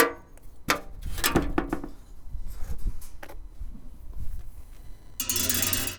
Sound effects > Objects / House appliances
Junkyard Foley and FX Percs (Metal, Clanks, Scrapes, Bangs, Scrap, and Machines) 127
Robot, FX, Atmosphere, scrape, rattle, garbage, SFX, dumping, Ambience, Machine, Junkyard, trash, Perc, tube, Metallic, Environment, Percussion, Bang, Clang, Dump, Clank, Bash, Robotic, Junk, Foley, Smash, Metal, waste